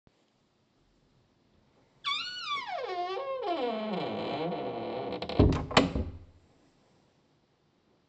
Soundscapes > Indoors
Creaking wooden door v01
Wooden room door creaks when closing